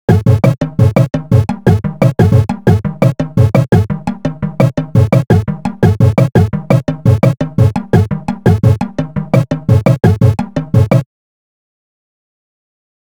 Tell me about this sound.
Music > Solo instrument
Very very very very dissonant lead
Highly dissonant lead
140bpm, lead, loop, synth, techno, trance